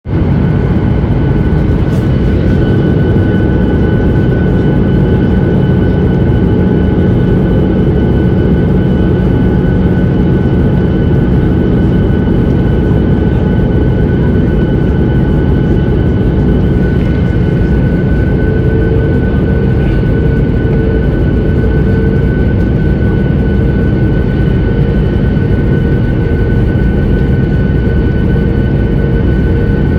Sound effects > Vehicles
Landing in an airplane + applause + pilote
landing to Marseille inside the aiplane the pilote is speaking recorded with my iphone